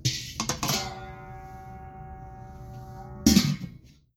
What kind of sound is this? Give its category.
Sound effects > Objects / House appliances